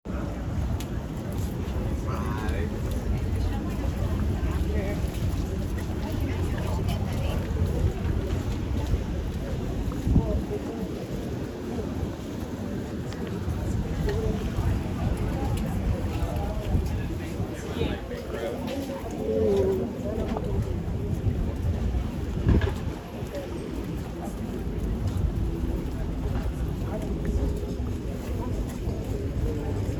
Soundscapes > Urban
Cars Recorded on August 31st at the RoosRoast cafe on Rosewood St, Ann Arbor, MI. Recorded on iPhone 13 mini. Dog leashes audible at various points.
cafe, conversation, dogs